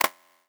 Instrument samples > Synths / Electronic
Clap one-shot made in Surge XT, using FM synthesis.